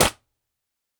Sound effects > Objects / House appliances
Subject : A Monster energy drink sodacan being crushed by foot on a plank of wood. In a concrete basement. Date YMD : 2025 October 31 Location : Albi Indoor. Hardware : Two Dji Mic 3 hard panned. One close on the floor, another an arm's length away abour 30cm high. Weather : Processing : Trimmed and normalised in Audacity. Fade in/out Notes : Tips : Saying "Dual mono" and "synced-mono" in the tags, as the two mics weren't really intended to give a stereo image, just two positions for different timbres.